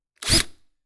Sound effects > Other mechanisms, engines, machines
Milwaukee impact driver foley-007
Household, Motor, Workshop, Scrape, Impact, Tools, fx, Woodshop, Mechanical, Tool, Shop, Foley, Drill, sfx, Metallic